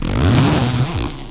Sound effects > Other
Recorded on a zoom recorder. This sound is someone zipping a zipper.